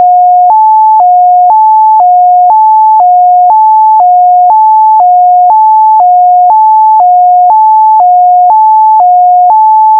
Sound effects > Vehicles
ambulance tone
Ambulance siren created using Python with numpy and sounddevice libraries.